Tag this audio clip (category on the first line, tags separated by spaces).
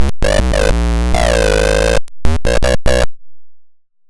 Sound effects > Electronic / Design
Electronic Alien Theremins Glitchy Scifi Optical DIY Trippy Sci-fi Glitch noisey Sweep Robot Instrument Electro Theremin Otherworldly Experimental Synth Robotic Analog Infiltrator Handmadeelectronic FX Dub SFX Bass Noise Spacey Digital